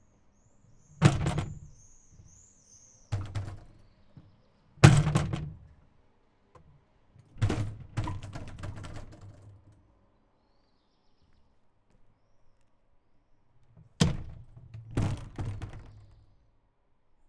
Sound effects > Other mechanisms, engines, machines
City's recessed bin - 250607 06h35 Albi
Subject : Recoding a bin that's mostly in the floor from Albi. Date YMD : 2025 06 07 (Saturday). Early morning. Time = 06h35. Location : Albi 81000 Tarn Occitanie France. Hardware : Tascam FR-AV2, Rode NT5 with WS8 windshield. Had a pouch with the recorder, cables up my sleeve and mic in hand. Weather : Grey sky. Little to no wind, comfy temperature. Processing : Trimmed in Audacity. Other edits like filter, denoise etc… In the sound’s metadata. Notes : An early morning sound exploration trip. I heard a traffic light button a few days earlier and wanted to record it at a calmer time.
2025, 81000, Albi, bin, City, clang, closing, Early, Early-morning, France, FR-AV2, hand-held, handheld, lid, Mono, morning, NT5, Occitanie, opening, Outdoor, Rode, Saturday, Single-mic-mono, Tarn, Tascam, urbain, Wind-cover, WS8